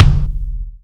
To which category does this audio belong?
Instrument samples > Percussion